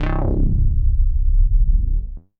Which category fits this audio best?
Sound effects > Experimental